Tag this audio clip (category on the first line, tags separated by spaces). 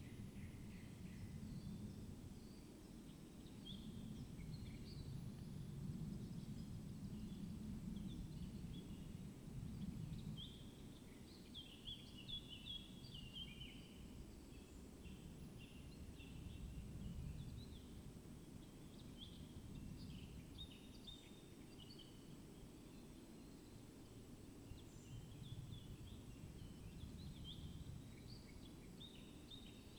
Soundscapes > Nature
phenological-recording sound-installation